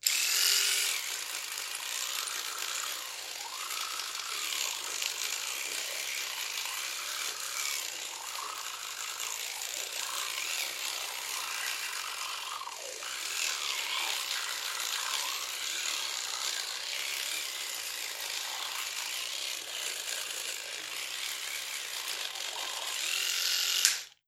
Sound effects > Objects / House appliances
MOTRElec-Samsung Galaxy Smartphone Brushing with Electric Toothbrush Nicholas Judy TDC
An electric toothbrush brushing someone's teeth.
Phone-recording, foley, teeth, toothbrush, electric, brush